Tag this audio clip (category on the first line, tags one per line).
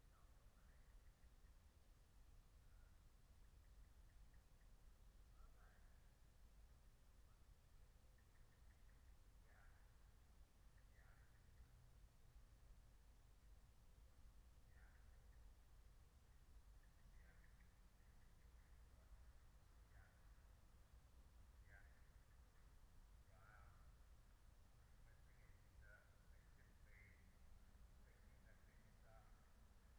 Soundscapes > Nature
alice-holt-forest
field-recording
meadow
nature
phenological-recording
raspberry-pi
soundscape